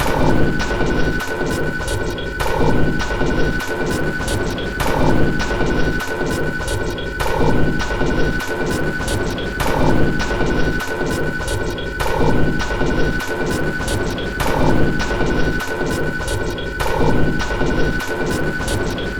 Instrument samples > Percussion
This 100bpm Drum Loop is good for composing Industrial/Electronic/Ambient songs or using as soundtrack to a sci-fi/suspense/horror indie game or short film.
Alien, Underground, Loop, Soundtrack, Industrial, Packs, Drum, Weird, Samples, Dark, Ambient, Loopable